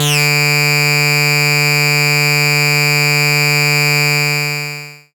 Instrument samples > Synths / Electronic
Acid Lead One Shoot 3 ( D Note)
303, Acid, Lead